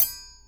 Sound effects > Objects / House appliances
Metal Tink Oneshots Knife Utensil 4
FX, SFX, Metal, ting, Beam, ding, Vibration, Foley, Perc, Klang, Clang, metallic, Trippy, Vibrate, Wobble